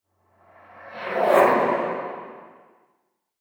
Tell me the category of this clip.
Sound effects > Electronic / Design